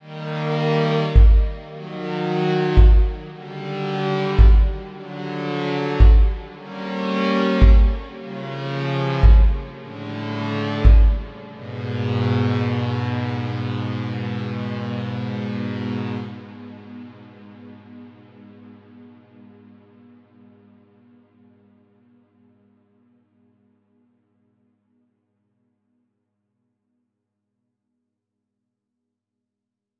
Music > Multiple instruments
made with fl studio